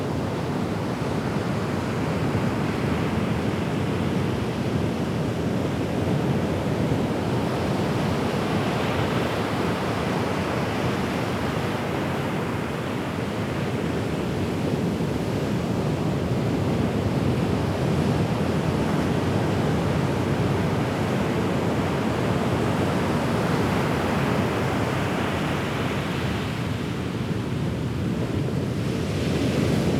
Soundscapes > Nature
Ambiance Ocean Ribeira Grande Loop Stereo 02
Ocean - Close/Medium Recording - Loop Recorded at Ribeira Grande, São Miguel. Gear: Sony PCM D100.
ribeiragrande, relaxation, foam, nature, ocean, seaside, shore, environmental, wind, coastal, ambience, sand, saomiguel, loop, marine, waves, surf, soundscape, sea, fieldrecording, stereo, portugal, natural, water, azores, beach